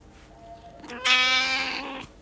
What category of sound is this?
Soundscapes > Nature